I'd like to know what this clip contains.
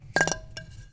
Music > Solo instrument
Marimba Loose Keys Notes Tones and Vibrations 29-001
perc, block, fx, woodblock, tink, thud, rustle, wood, foley, loose, marimba, keys, notes, oneshotes, percussion